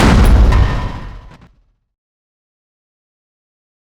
Sound effects > Other
Sound Design Elements Impact SFX PS 111
All samples used in the production of this sound effect are field recordings recorded by me. Recording gear-Tascam Portacapture x8 and Microphone - RØDE NTG5.The samples of various types of impacts recorded by me were layered in Native Instruments Kontakt 8, then the final audio processing was done in REAPER DAW.
audio, blunt, cinematic, collision, crash, design, effects, explosion, force, game, hard, heavy, hit, impact, percussive, power, rumble, sfx, sharp, shockwave, smash, sound, strike, thudbang, transient